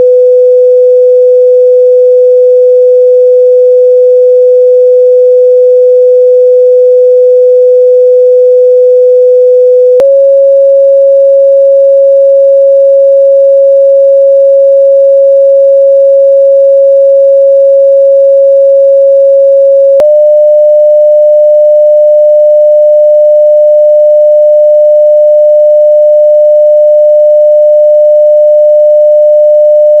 Sound effects > Experimental
Color palette Green to Orange
Here are the 13 frequencies I did in hertz : 500 Green 550 Green 600 Green 675 Green 750 Green 1000 (start of nuance) 1500 2500 3000 3500 Yellow 4000 4500 5000 Orange
colour, preview